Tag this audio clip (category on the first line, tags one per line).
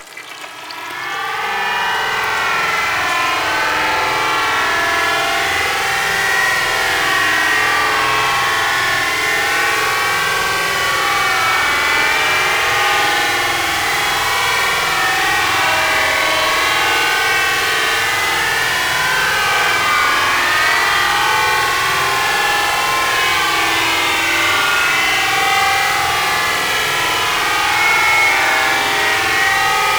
Soundscapes > Synthetic / Artificial
bassy
glitch
howl
roar
sfx
slow
texture